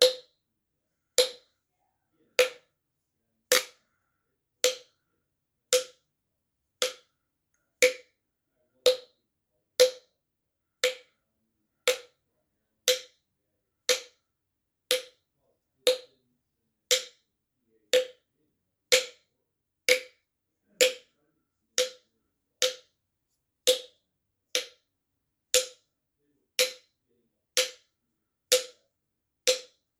Sound effects > Objects / House appliances
TOONImpt-Samsung Galaxy Smartphone, CU Wood Bonks, Humorous Nicholas Judy TDC
Humorous wood bonks.